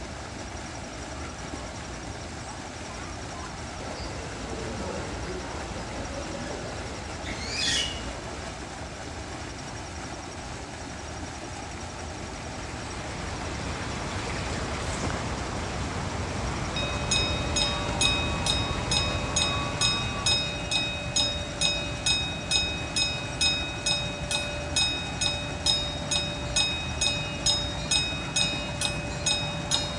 Soundscapes > Nature
Soundscape of Zoo for Game Tools UC
This soundscape features noises of squawking birds, a zoo train moving through the zoo, flamigos chirping and fighting, water features, and bugs chirping. These noises were all recorded by me using Voice Record Pro on my phone and are a compilation of sounds I heard around the zoo.
Train, Nature, Birds